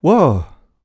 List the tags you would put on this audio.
Speech > Solo speech
dialogue
FR-AV2
Human
impressed
Male
Man
Mid-20s
Neumann
NPC
oneshot
singletake
Single-take
surprised
talk
Tascam
U67
Video-game
Vocal
voice
Voice-acting
wow
wowed

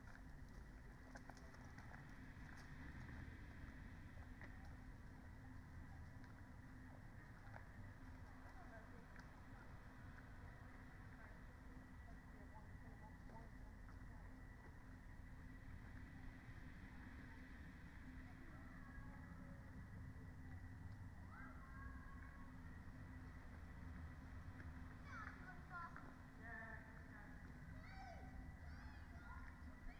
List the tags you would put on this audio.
Soundscapes > Nature
soundscape
natural-soundscape
data-to-sound
raspberry-pi
modified-soundscape
Dendrophone
phenological-recording
nature
alice-holt-forest
sound-installation

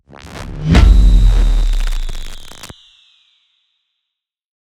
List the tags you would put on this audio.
Sound effects > Electronic / Design
blast
lfe
cinematic
impact
boom
suspense
orchestral
dark
movie
plasma
design
film
cinema
drama
filmscore
movies

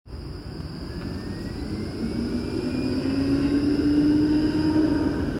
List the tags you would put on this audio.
Sound effects > Vehicles
city
public-transport
tram

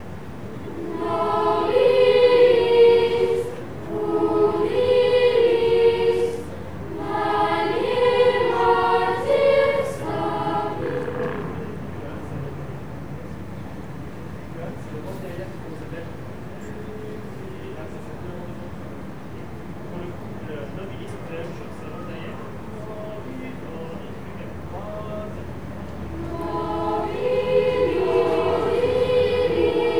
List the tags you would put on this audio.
Soundscapes > Other
child
choral

